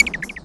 Instrument samples > Synths / Electronic
CR5000-stick 01
80s, Beat, Drum, DrumMachine, Electronic, Synth, Vintage